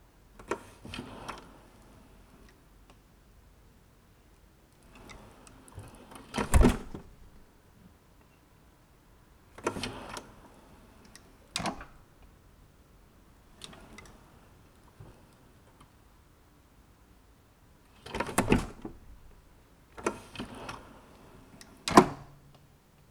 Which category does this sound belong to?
Sound effects > Human sounds and actions